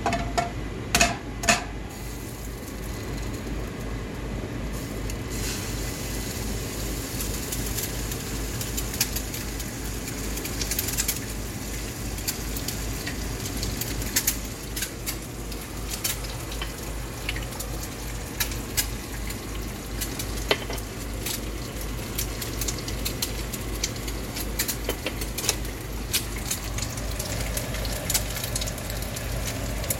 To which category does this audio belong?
Sound effects > Objects / House appliances